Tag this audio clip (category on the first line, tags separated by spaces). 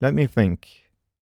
Speech > Solo speech
2025 Adult Calm Generic-lines hesitant Hypercardioid july let-me-think Male mid-20s MKE-600 MKE600 Sennheiser Shotgun-mic Shotgun-microphone Single-mic-mono Tascam thinking VA